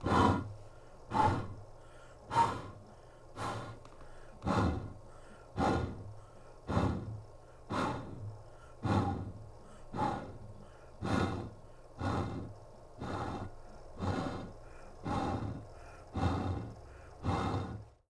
Sound effects > Objects / House appliances
Air being blown into a plastic soda bottle.